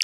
Instrument samples > Percussion
Organic-Water Snap 4.1
Botanical, EDM, Glitch, Organic, Snap